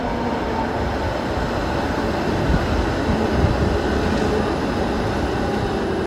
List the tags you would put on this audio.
Soundscapes > Urban
public tram vehicle

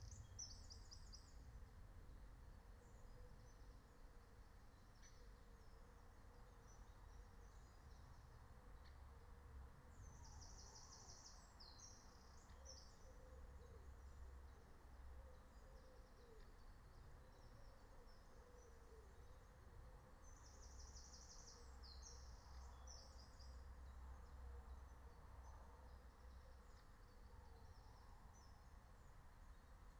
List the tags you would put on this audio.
Soundscapes > Nature
field-recording meadow natural-soundscape nature raspberry-pi soundscape

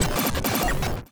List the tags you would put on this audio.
Sound effects > Electronic / Design
digital Glitch hard one-shot pitched stutter

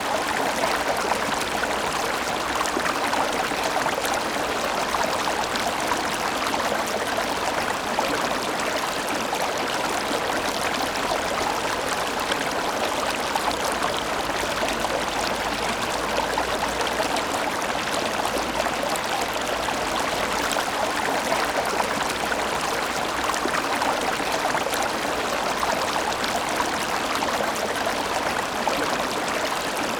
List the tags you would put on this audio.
Soundscapes > Nature
aquatic babbling brook creek flowing gentle gurgle little murmur natural nature noise quietpeaceful ripple river serene sounds stream trickling water

